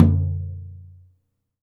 Music > Solo instrument
Low Floor Tom Sonor Force 3007-003
Metal, Hat, Drums, FX, Drum, Cymbal, Sabian, Paiste, Perc, Percussion, Ride, Kit, GONG, Crash, Cymbals, Custom, Oneshot